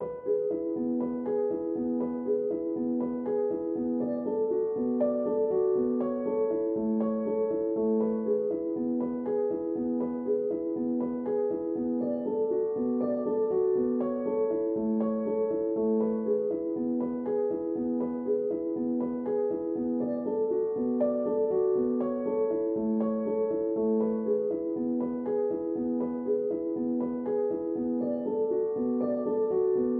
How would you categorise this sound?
Music > Solo instrument